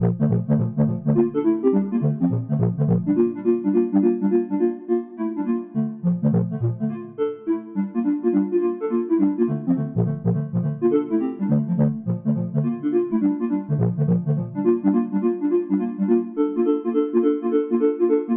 Music > Solo instrument
Big Dog and Little Dog
Synth sounds that paint a musical portrait of two dogs, one big and one little, running around and playing together. Made with my MIDI controller.